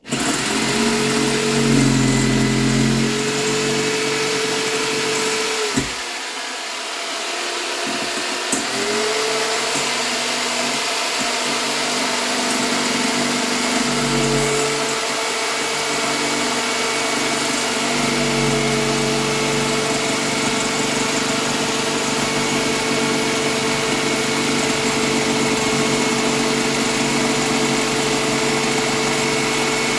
Sound effects > Objects / House appliances
TOOLPowr-Samsung Galaxy Smartphone, CU Skill Saw, Start, Run, Stop Nicholas Judy TDC
A skill saw starting, running and stopping.
Phone-recording, run, skill-saw, start, stop